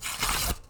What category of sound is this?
Sound effects > Objects / House appliances